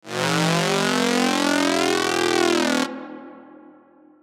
Sound effects > Electronic / Design
cybernetic soldier (cyberpunk ambience)
some synthwave/cyberpunk style sound design this morning
techno, synth, retro, synthwave